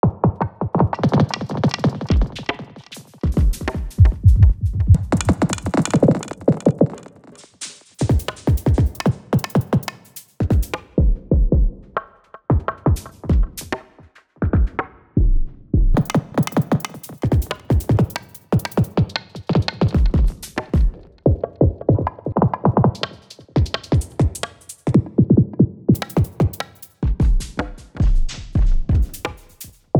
Music > Other
Atonal Drum Rambling Down on the Stairs Texture
A texture made out of the Digitakt factory samples
delay, drum, texture